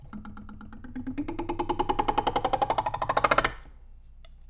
Sound effects > Objects / House appliances
Metal Beam Knife Plank Vibration Wobble SFX 10
ting, Vibration, Wobble, Clang, Perc, Klang, Vibrate, metallic